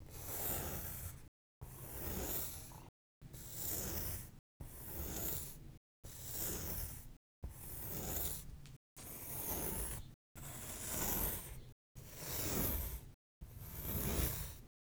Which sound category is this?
Sound effects > Objects / House appliances